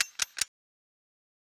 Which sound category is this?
Sound effects > Other mechanisms, engines, machines